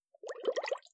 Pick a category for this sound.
Sound effects > Natural elements and explosions